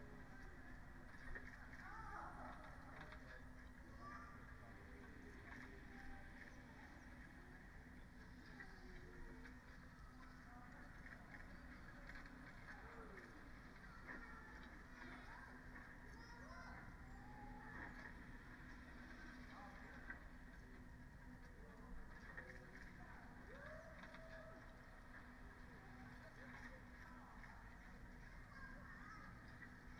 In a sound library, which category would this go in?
Soundscapes > Nature